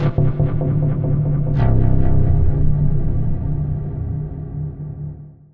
Instrument samples > Synths / Electronic
CVLT BASS 82
bass, bassdrop, drops, low, subbass, synth, wavetable, wobble